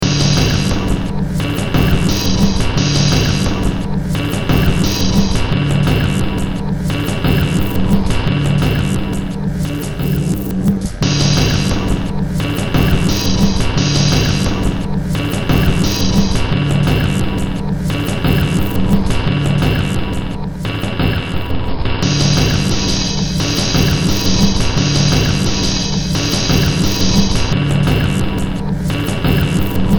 Music > Multiple instruments
Short Track #3765 (Industraumatic)
Soundtrack, Horror, Ambient, Sci-fi, Cyberpunk, Games, Underground, Industrial, Noise